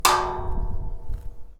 Sound effects > Objects / House appliances
Junkyard Foley and FX Percs (Metal, Clanks, Scrapes, Bangs, Scrap, and Machines) 24
waste, rubbish, Perc, rattle, FX, Junk, Percussion, Smash, scrape, Ambience, Metallic, Foley, Clank, tube, Junkyard, SFX, Bash, trash, Atmosphere, Environment, dumping, Bang, Metal, Robot, Robotic, dumpster, garbage, Clang, Dump, Machine